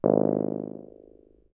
Sound effects > Experimental
Analog Bass, Sweeps, and FX-188
bassy, effect, electronic, fx, mechanical, pad, sci-fi, weird